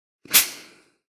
Sound effects > Objects / House appliances
SteamIron SteamBlast Pulse V02
A short, focused steam blast from a household steam iron. Works well as a subtle accent, pressure release, or interface detail.